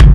Instrument samples > Percussion
kick Nile 1
bass-drum, hit, percussion